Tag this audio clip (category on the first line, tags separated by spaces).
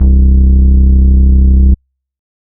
Instrument samples > Synths / Electronic
bass vst vsti synth